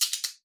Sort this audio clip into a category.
Instrument samples > Percussion